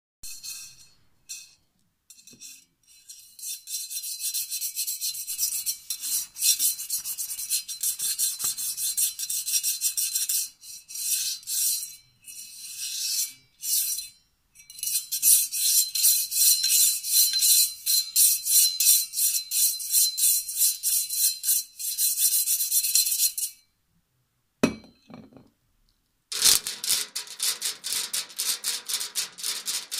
Sound effects > Objects / House appliances
Metal Objects – Scraping and Rubbing Sounds
This snippet features various metal objects being scraped and rubbed together, recorded with an older mobile phone. The lo-fi quality captures a raw, abrasive texture, with sounds reminiscent of a grater, followed by sharp, repetitive tic-tic-tic sounds as a metal rod strikes another object. Perfect for adding harsh, industrial details or irritating background noise to your projects.